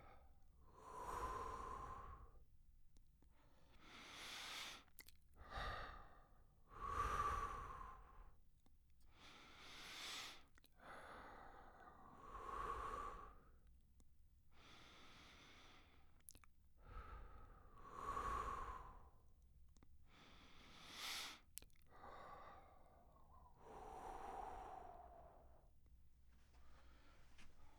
Sound effects > Human sounds and actions
Smoke Exhale Blow Humdrum
Sound of a cigarette being smoked, with the intake and a nice exhale.
release, smoking, inhale